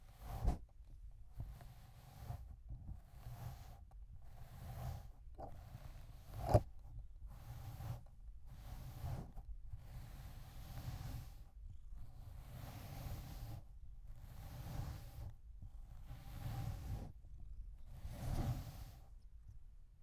Sound effects > Objects / House appliances
Brushing cat - MS RAW
Recorded 2025 05 14 for Dare 2025-08 on the theme of friction specifically on fabrics. When my room-mate cleaned the couch from all the cat hair, by using the cat brush, I figured it might be a first recording of such an event, so I remade it. The cat hearing it and wanting attention, mic already setup, I recorded brushing the cat too. Not quite fabric yet but I'm going to count the hairball in anyway. I clamped my zoom h2n on the brush pointing towards it. Set in MS raw mode. So left is mid, right is side. To be converted to regular stereo or mono.
MS-RAW, H2n, cat, RAW, cat-brush, fur, Dare2025-08, brushing, MS, brush, Dare2025-Friction